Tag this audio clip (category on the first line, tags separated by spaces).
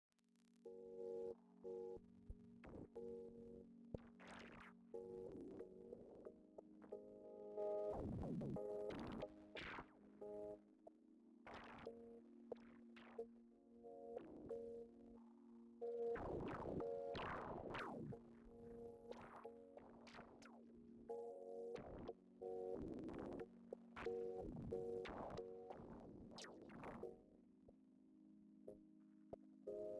Music > Solo instrument
analog granular-spikes granular synthesis